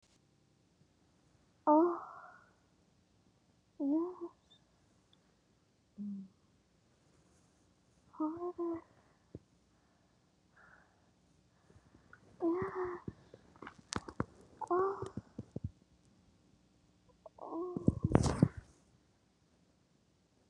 Sound effects > Human sounds and actions
Sex Doggy-Style Orgasm
Having sex with a woman- orgasm! Listen to this for some porn!
Orgasm, Sex, Porn, Moaning, Groaning